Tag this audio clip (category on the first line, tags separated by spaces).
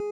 Instrument samples > String
arpeggio design stratocaster